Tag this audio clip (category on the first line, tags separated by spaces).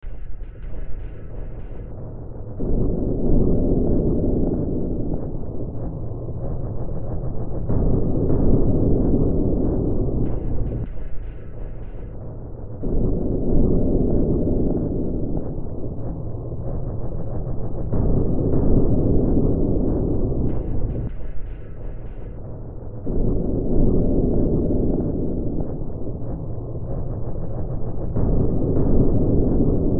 Soundscapes > Synthetic / Artificial
Ambience Ambient Darkness Drone Games Gothic Hill Horror Noise Sci-fi Silent Soundtrack Survival Underground Weird